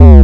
Instrument samples > Synths / Electronic
"NO ESTILO DJ MANDRAKE" Kick 02
kick, kick-drum, drum-kit, percussion, drum, drums, electronic, one-shot, bass-drum, perc